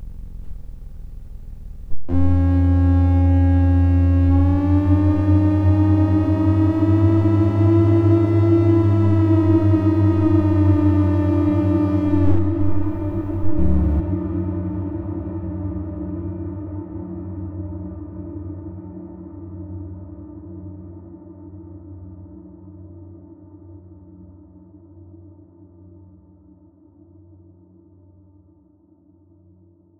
Soundscapes > Synthetic / Artificial
sfx5 = Plaintive Sci Fi Droning in a Canyon
Another DIY electronics build: this one, properly tuned, drones with a weird double voice. I captured this clean sample and added heavy reverb. Sounds like something has gone terribly wrong on some faraway planet.
drone, soundscape, ambient